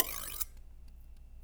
Sound effects > Objects / House appliances
knife and metal beam vibrations clicks dings and sfx-052
Clang, SFX, Wobble, metallic, Perc, Vibration, Beam, Klang, Metal, Foley, FX, ting, Vibrate, Trippy, ding